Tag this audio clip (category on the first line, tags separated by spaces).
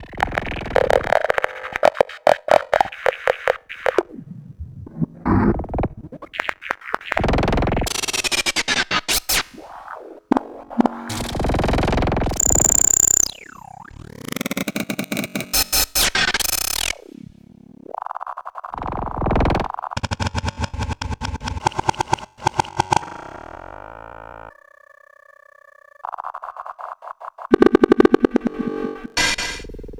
Sound effects > Electronic / Design
acousmatic tape-manipulation